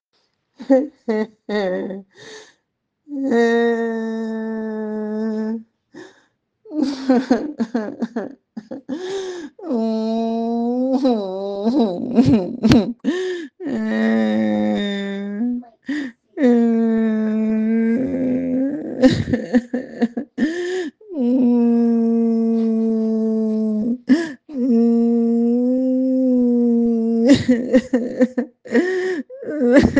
Speech > Solo speech
This is Dr Yemisi Ekor imitating an older child crying. Recorded in a room with minimal background sound on my iPhone 12, no processing. We use this sound to enhance realism of healthcare simulations. First used University of Ghana Medical Centre MTSC for SimPACT May 2025.